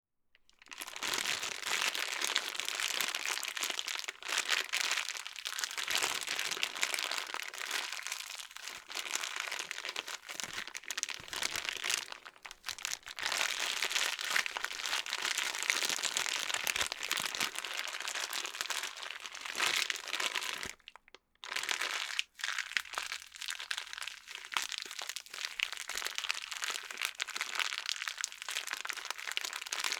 Sound effects > Objects / House appliances
OBJPack Cellophane
Handling and crushing cellephane style food packaging in my hand in a small office.
crush, food